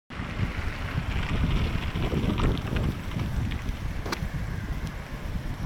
Sound effects > Vehicles
Car 2025-11-02 klo 13.27.56
Sound recording of a car passing by in windy conditions. Recording done next to Hervannan valtaväylä, Hervanta, Finland. Sound recorded with OnePlus 13 phone. Sound was recorded to be used as data for a binary sound classifier (classifying between a tram and a car).
Car, Field-recording, Finland